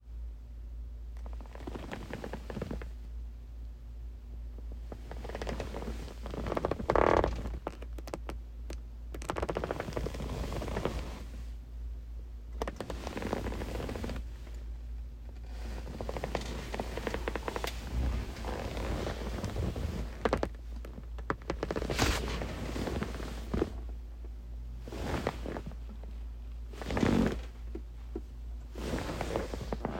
Sound effects > Vehicles
Leather Car Seat 2
Leather seat in a Jeep Wrangler Sahara. Sound of someone sitting in the chair, and movement in the chair.
auto, automobile, car, chair, interior, leather, seat